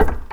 Sound effects > Other mechanisms, engines, machines
metal shop foley -012
bang, wood, tink, thud, percussion, shop, oneshot, little, strike, boom, fx, perc, metal, pop, sfx, knock, bam, rustle, foley, bop, sound, tools, crackle